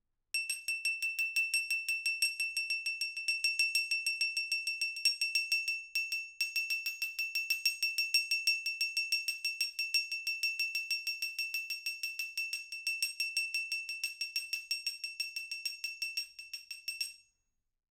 Sound effects > Other
Glass applause 38
XY, wine-glass, solo-crowd, Tascam, clinging, FR-AV2, NT5, single, indoor, glass, individual, Rode, cling, applause, person, stemware